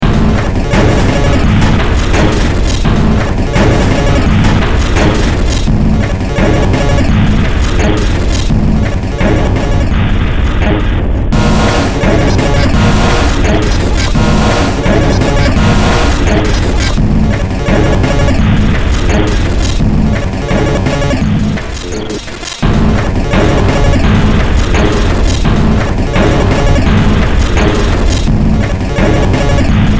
Music > Multiple instruments
Short Track #3716 (Industraumatic)
Ambient, Cyberpunk, Underground, Games, Industrial, Horror, Noise, Soundtrack, Sci-fi